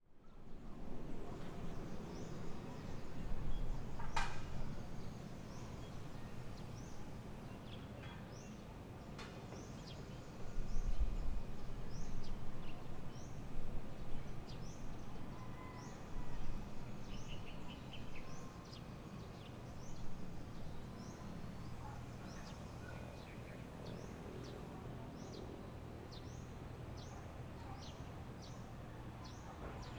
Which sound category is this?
Soundscapes > Urban